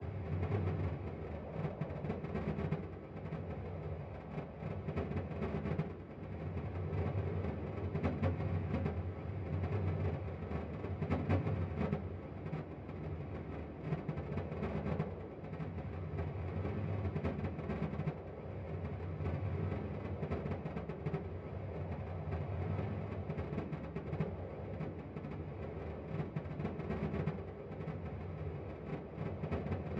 Soundscapes > Synthetic / Artificial
Rain outside (with wind)
Ambient noise made in DAW to imitate raindrops (and rain) banging on a window while a listener is located in a house. This version contains rain sounds and wind noise.
weather, wind